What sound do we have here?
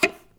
Sound effects > Other mechanisms, engines, machines
Handsaw Oneshot Metal Foley 20
vibe, fx, handsaw, foley, smack, plank, household, twangy, percussion, vibration, metal, tool, hit, sfx, saw, shop, twang, perc, metallic